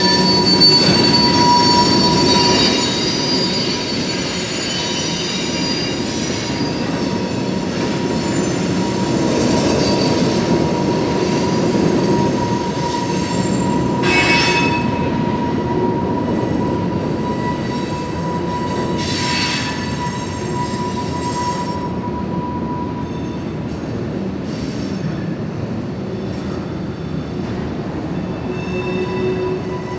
Sound effects > Vehicles
A freight train leaving Portland Oregon, going over a bridge, but there is another layer of bridge above the train so it has some reverberation as though it was in a tunnel.